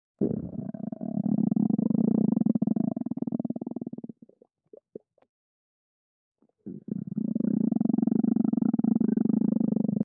Sound effects > Human sounds and actions
My first audio recording (Ai generated)
This is an AI generated sound, plus, it's my first upload.
Audio, KlingAI, SFX